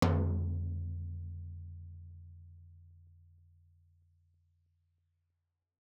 Music > Solo percussion
Floor Tom Oneshot -030 - 16 by 16 inch
rim, tom, beats, toms, instrument, floortom, acoustic, kit, tomdrum, drumkit, drum, oneshot, beat, percs, roll, velocity, fill, percussion, beatloop, flam, studio, rimshot, drums, perc